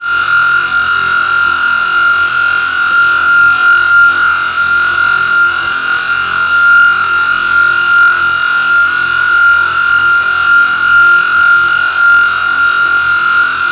Sound effects > Electronic / Design
Sawing Atomosphare 4
I synth it with phasephant and 3xOSC! I was try to synth a zaag kick but failed, then I have a idea that put it into Granular to see what will happen, the result is that I get this sound.
IDM, Industry